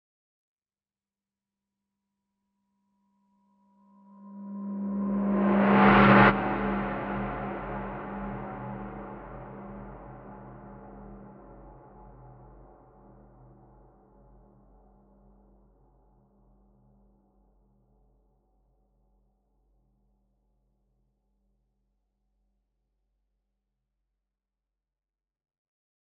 Sound effects > Other
RISR Cinematis HalloweenSpecial Vol5 Chilling 9 Freebie
An icy, suspense-building riser that sends chills down your spine. This is one of the three freebies from my Halloween Special | Vol.5 pack.
creepy, halloween, hounted, riser, scary, SFX, spooky